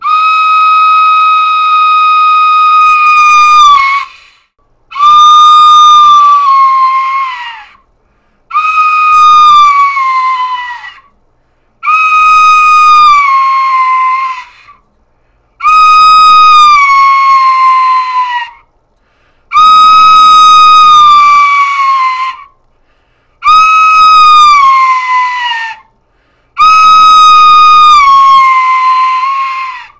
Speech > Solo speech
TOONVox-Blue Snowball Microphone, CU Recorder, Imitation, Woman Scream Nicholas Judy TDC
A recorder imitating a woman screaming.
scream, recorder